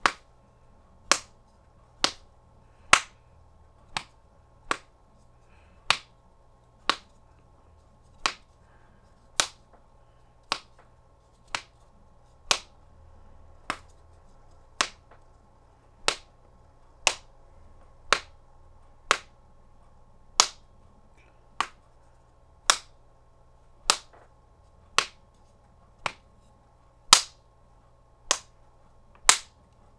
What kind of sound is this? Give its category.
Sound effects > Human sounds and actions